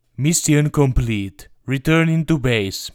Speech > Solo speech
Mission complete. Returning to base
videogame, calm, human, male, voice